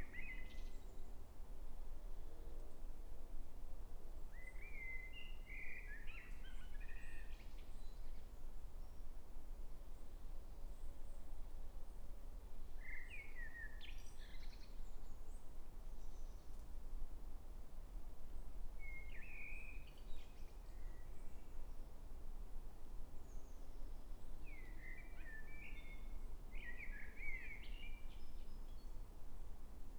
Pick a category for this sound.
Soundscapes > Nature